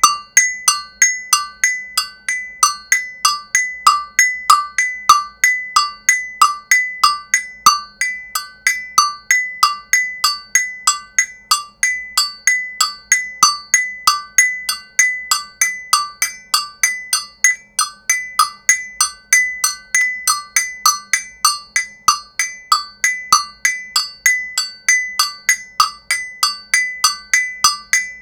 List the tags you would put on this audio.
Music > Solo percussion
bells
agogo
simulation
clock
cartoon
tick
Blue-Snowball
agogo-bells
Blue-brand

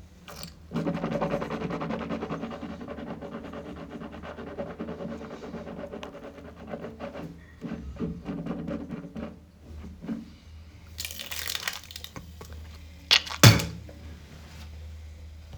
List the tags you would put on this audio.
Sound effects > Vehicles
away
gettig
helicopter

